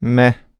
Speech > Solo speech
Annoyed - Meh
annoyed, dialogue, FR-AV2, grumpy, Human, Male, Man, Mid-20s, Neumann, NPC, oneshot, singletake, Single-take, talk, Tascam, U67, upset, Video-game, Vocal, voice, Voice-acting